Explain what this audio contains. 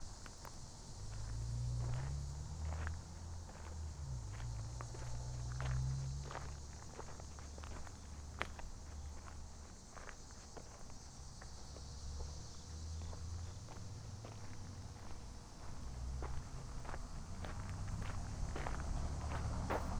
Sound effects > Natural elements and explosions
Some footfalls on pebbly dirt. I recorded this with zoom h1 essential.